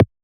Instrument samples > Synths / Electronic
A short kick one-shot made in Surge XT, using FM Synthesis.
synthetic, surge, electronic, fm